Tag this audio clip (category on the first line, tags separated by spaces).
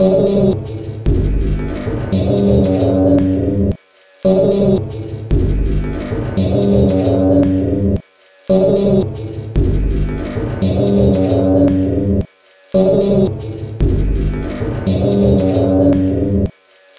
Instrument samples > Percussion
Ambient Packs Dark Drum Alien Soundtrack Weird Loop Loopable Underground Samples Industrial